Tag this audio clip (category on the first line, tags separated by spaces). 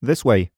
Speech > Solo speech
mid-20s Hypercardioid Generic-lines Tascam movement Voice-acting MKE-600 Single-mic-mono july Shotgun-microphone Calm FR-AV2 MKE600 Sennheiser VA Shotgun-mic Adult 2025 Male this-way